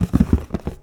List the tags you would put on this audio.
Sound effects > Objects / House appliances
clang debris household tool bucket plastic metal pail knock liquid water garden scoop object shake hollow clatter handle slam cleaning drop kitchen spill pour container tip foley carry fill lid

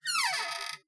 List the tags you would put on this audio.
Sound effects > Other mechanisms, engines, machines
Creaky Hinge Squeaky

